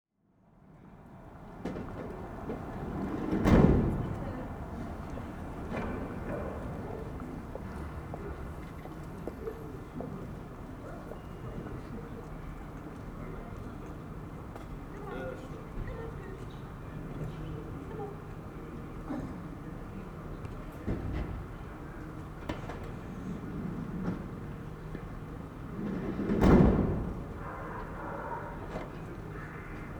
Soundscapes > Urban
An early morning recording in Lichfield city centre using my new Roland CS-10EM's Binaural Mics.
ambience, city-centre, field-recording, lichfield, morning, public, quiet